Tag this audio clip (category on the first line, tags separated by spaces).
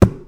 Sound effects > Objects / House appliances
slam carry clatter hollow bucket clang household tip container tool pour fill plastic scoop shake foley object knock